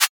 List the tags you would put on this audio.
Instrument samples > Synths / Electronic
fm synthetic electronic surge

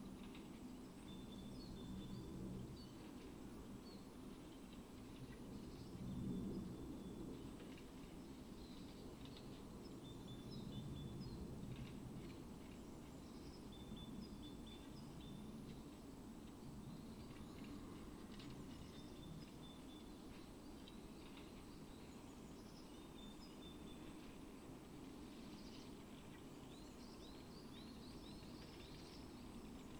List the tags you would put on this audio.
Soundscapes > Nature
phenological-recording data-to-sound natural-soundscape artistic-intervention raspberry-pi Dendrophone alice-holt-forest nature modified-soundscape weather-data sound-installation field-recording soundscape

